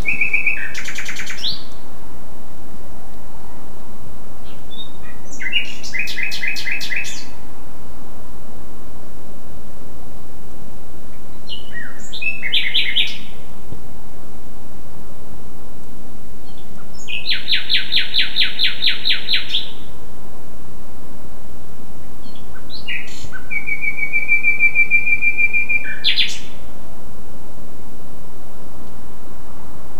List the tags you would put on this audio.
Sound effects > Animals
nightingale ambiance bird nature birds

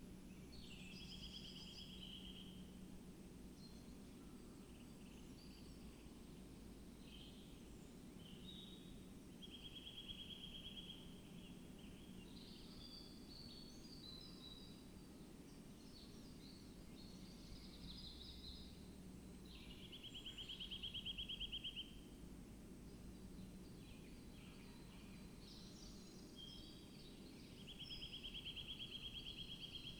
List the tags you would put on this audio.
Soundscapes > Nature
weather-data sound-installation alice-holt-forest soundscape natural-soundscape data-to-sound phenological-recording Dendrophone nature field-recording

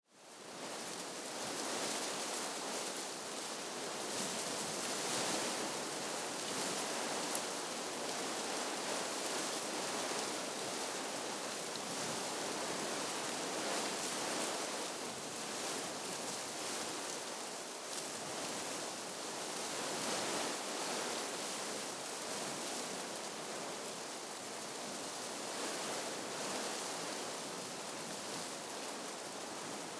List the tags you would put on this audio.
Sound effects > Natural elements and explosions
gust gusts plant field-recording dry winter wind

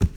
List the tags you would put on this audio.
Sound effects > Objects / House appliances
hollow tool knock cleaning spill tip container lid plastic shake household garden drop fill debris clatter foley slam scoop pour bucket handle clang metal carry liquid kitchen object

